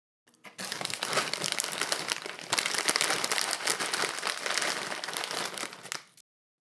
Sound effects > Other
FOODEat Cinematis RandomFoleyVol2 CrunchyBites Food.Bag Bruschetta Rustle Long 02 Freebie
crunch, handling, foley, snack, recording, bag, effects, texture, postproduction, food, design, SFX, crunchy, bruschetta, sound, rustle, plastic, bites, bite